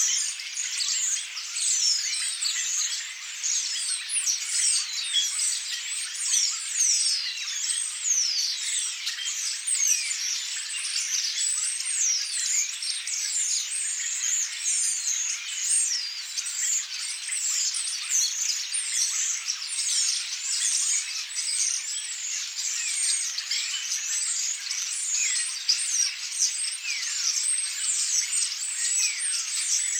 Sound effects > Animals
An recording of common starling. Edited in RX11.